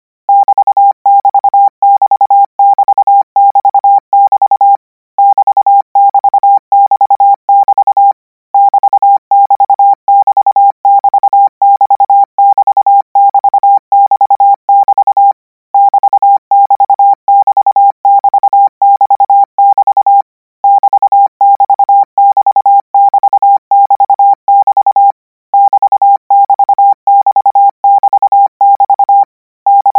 Sound effects > Electronic / Design
Practice hear symbol '=' use Koch method (practice each letter, symbol, letter separate than combine), 200 word random length, 25 word/minute, 800 Hz, 90% volume.